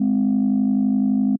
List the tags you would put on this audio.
Instrument samples > Synths / Electronic
Holding-Tone
Landline-Phone
Landline-Holding-Tone
Tone-Plus-386c
just-minor-third
Landline-Phonelike-Synth
Landline-Telephone
JI-Third
just-minor-3rd
Landline-Telephone-like-Sound
Landline
Old-School-Telephone
Synth
JI-3rd
JI

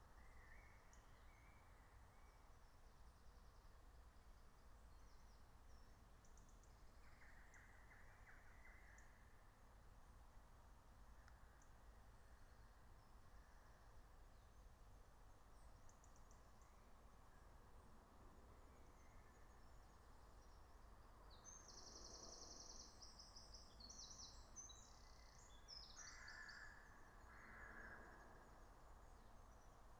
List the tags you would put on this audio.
Soundscapes > Nature
alice-holt-forest
soundscape
field-recording
nature
phenological-recording
natural-soundscape
meadow
raspberry-pi

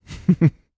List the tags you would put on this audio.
Speech > Solo speech
2025
Adult
Calm
FR-AV2
Generic-lines
humpf
Hypercardioid
july
laughing
Male
mid-20s
MKE-600
MKE600
Sennheiser
Shotgun-mic
Shotgun-microphone
Single-mic-mono
Tascam
VA
Voice-acting

